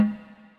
Music > Solo percussion
Snare Processed - Oneshot 93 - 14 by 6.5 inch Brass Ludwig
acoustic, beat, brass, drumkit, drums, flam, fx, hit, hits, kit, ludwig, oneshot, perc, percussion, processed, realdrum, realdrums, rim, rimshot, rimshots, roll, sfx, snare, snaredrum, snareroll, snares